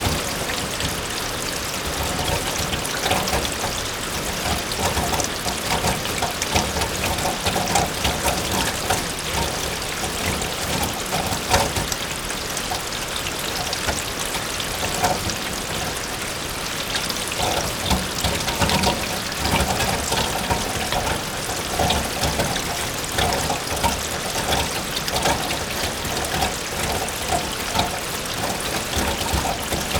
Soundscapes > Nature
Rain & Water Going Through a Roof Duct
The Sound of Rainwater going through a Roof Duct with Heavy Rain in the Background. Recorded with a ZOOM H6 and a Sennheiser MKE 600 Shotgun Microphone. Go Create!!!
Storm, Rain, Nature, Enviroment, Water